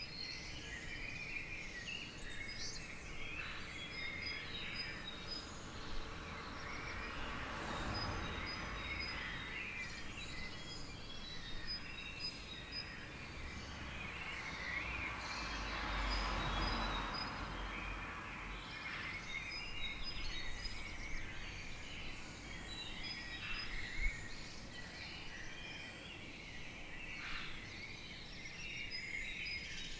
Soundscapes > Urban
250601 05h45 Albi SM57 25cm 90o
Trying to see how the SM57 could be used as field-recording microphones. Spoilers I need to bump the gain up a lot, I way prefer my Rode NT5 (more compact, lighter, better signal, more clarity) Recorded with two SM57 25cm appart and 90° angle seperation. using a Tascam FR-AV2. Recorded indoors, in my bedroom.
2025, 25cm, 90degree, A2WS-Windcover, birds, FR-AV2, Indoor, June, morning, road, Shure, Sm57, Tascam